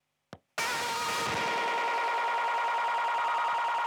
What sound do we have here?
Sound effects > Electronic / Design
guitar Delay feedback 2
harmonic, soundscape